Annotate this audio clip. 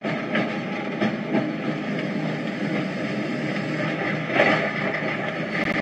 Sound effects > Vehicles
tram sounds emmanuel 13
23, line, tram